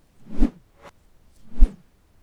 Sound effects > Experimental
Stick - Reverse Whooshes
Subject : A whoosh sound made by swinging a stick. Recorded with the mic facing up, and swinging above it. Date YMD : 2025 04 21 Location : Gergueil France. Hardware : Tascam FR-AV2, Rode NT5. Weather : Processing : Trimmed and Normalized in Audacity. Fade in/out.